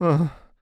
Speech > Solo speech
Fear - worry sound
oneshot, U67, worrying, singletake, Single-take, talk, voice, NPC, Male, Human, Tascam, Mid-20s, worry, Video-game, dialogue, Voice-acting, Neumann, Vocal, fear, Man, FR-AV2